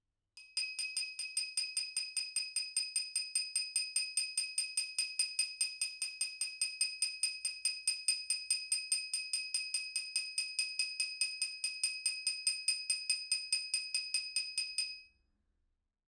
Sound effects > Other
Glass applause 19
Rode, indoor, stemware, wine-glass, Tascam, FR-AV2, NT5, individual, XY, person, clinging, cling, glass, single, solo-crowd, applause